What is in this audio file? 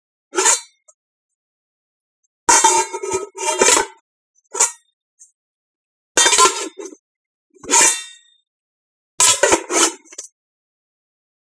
Sound effects > Objects / House appliances
metal-bowls-stacking
Stacking metal bowls. Recorded with Zoom H6 and SGH-6 Shotgun mic capsule.